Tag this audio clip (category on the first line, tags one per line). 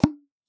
Sound effects > Objects / House appliances
ribbon
satin-ribbon
tone